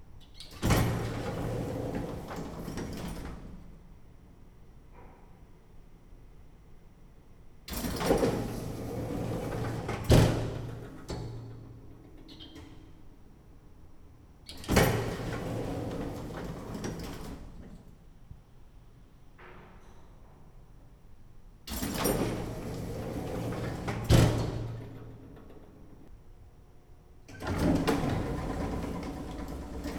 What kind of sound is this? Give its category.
Sound effects > Other mechanisms, engines, machines